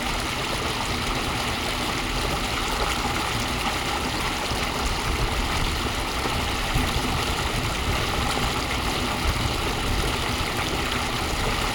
Soundscapes > Nature
Irrigation Waterfall
Waterfall within an irrigation ditch recorded on my phone microphone the OnePlus 12R
splash, waterfall, water